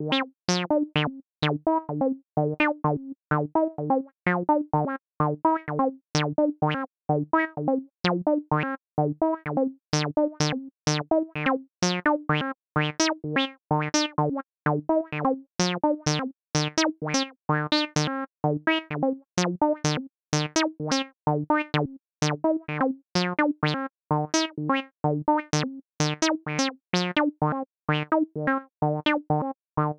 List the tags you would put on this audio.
Music > Solo instrument
Recording
TB-03
synth
electronic
303
Acid
Roland
hardware
techno
house

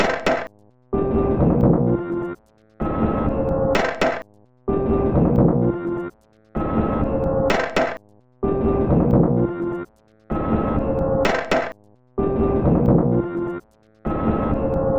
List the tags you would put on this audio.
Instrument samples > Percussion
Alien
Industrial
Packs
Underground

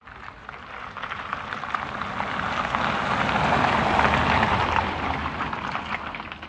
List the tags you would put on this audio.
Sound effects > Vehicles
driving
electric
vehicle

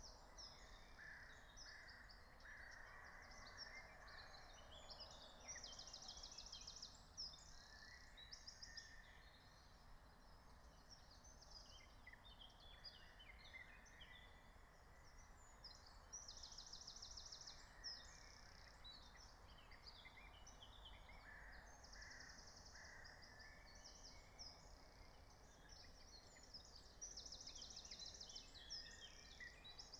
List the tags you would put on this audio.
Soundscapes > Nature
raspberry-pi
phenological-recording
alice-holt-forest
field-recording
soundscape
nature
meadow
natural-soundscape

